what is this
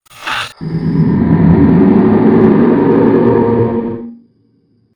Sound effects > Other
Scary Monster Jumpscare
I recorded my own voice and slowed down the pitched and edited with reverb fx made with using lexis audio editor app.
Sound-design, Monster, Growl, Creature, Scary, Robot, Fnaf, Roar, Jumpscare